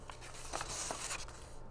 Sound effects > Objects / House appliances
OBJBook-Blue Snowball Microphone Book, Page, Turn 05 Nicholas Judy TDC
Blue-Snowball, book, page